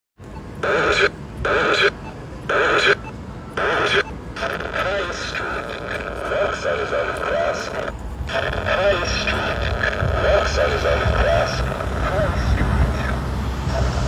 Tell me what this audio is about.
Speech > Processed / Synthetic
Malfunctioning Robotic Voice At Crosswalk
Malfunctioning synthetic voice at a city crosswalk. This is intended to assist visually impaired pedestrians by alerting when it is safe to enter the crosswalk.
Glitch, Malfunction, voice